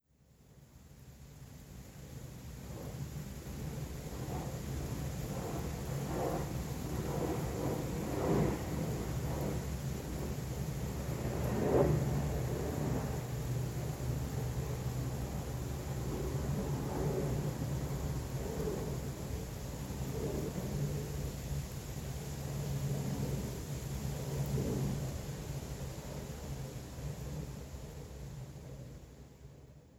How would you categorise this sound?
Sound effects > Vehicles